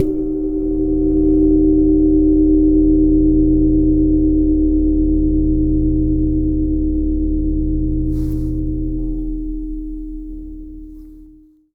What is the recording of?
Music > Solo instrument
Paiste 22 Inch Custom Ride-001
Ride, Metal, Drum, Oneshot, 22inch, Custom, Drums, Paiste, Percussion, Cymbals, Cymbal, Kit, Perc